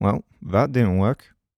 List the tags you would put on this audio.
Speech > Solo speech
2025 Adult Calm FR-AV2 Generic-lines Hypercardioid july Male mid-20s MKE-600 MKE600 not-working Sennheiser Shotgun-mic Shotgun-microphone Single-mic-mono Tascam VA Voice-acting well-that-didnt-work

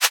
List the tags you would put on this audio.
Instrument samples > Synths / Electronic
electronic fm surge synthetic

